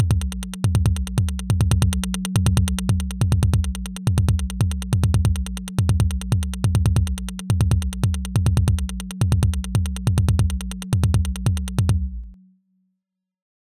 Music > Multiple instruments
13 second percussive sound made with Mallets and Kicker in LMMS. Suitable for livestream backgrounds, stream intros, or UI music. Clean, and minimal.